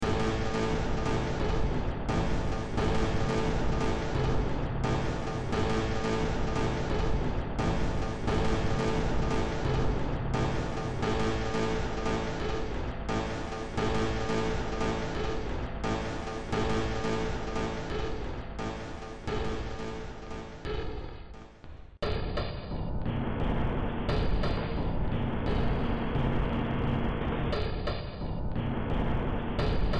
Music > Multiple instruments
Demo Track #3634 (Industraumatic)
Underground, Industrial, Noise, Ambient, Games, Soundtrack, Sci-fi, Horror, Cyberpunk